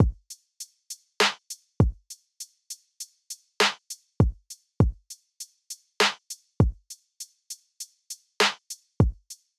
Instrument samples > Percussion
halftime trap drum loop (120bpm)
All the free wavs in this pack are Ableton drum racks that I've cut into loops and exported from old beats that never left my computer. They were arranged years ago in my late teens, when I first switched to Ableton to make hip-hop/trap and didn't know what I was doing. They are either unmixed or too mixed with reverb built in. Maybe I'm being too harsh on them. I recommend 'amen breaking' them and turning them into something else, tearing them apart for a grungy mix or layering to inspire pattern ideas. That's what I love doing with them myself.
drums, sample, trap, 60bpm